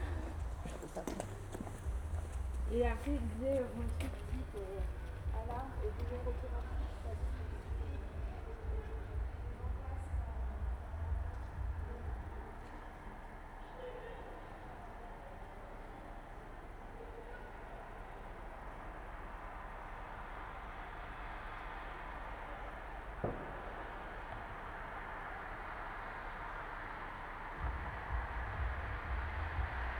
Soundscapes > Urban
20251231 23h55 Albi arret de bus Strasbourg - Happy new year!
Subject : The new year heard from Albi madeleine side. New year 5min in recording. Date YMD : 2025 December 31st at 23h55 until 2026 at 00h10 Location : Strasburg bus stop at Albi 81000 Tarn Occitanie France. Hardware : Dji Mic 3 TX Weather : -2°c ish Processing : Trimmed and normalised in Audacity.
ambience, french